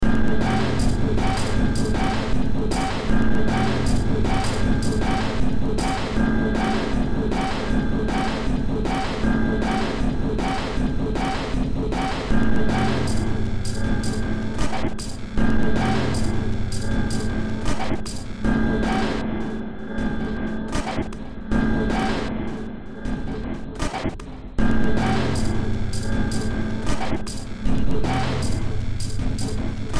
Music > Multiple instruments
Short Track #3979 (Industraumatic)
Ambient, Cyberpunk, Games, Horror, Industrial, Noise, Sci-fi, Soundtrack, Underground